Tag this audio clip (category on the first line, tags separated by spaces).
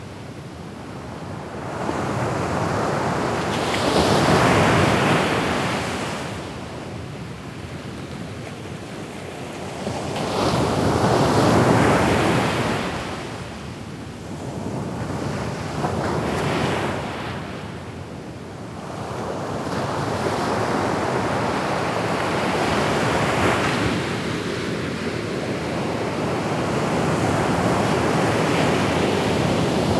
Soundscapes > Nature
seaside water soundscape wind natural portugal nature loop ambience waves relaxation saomiguel environmental ocean fieldrecording coastal shore sea azores foam praiadosmoinhos beach sand stereo marine surf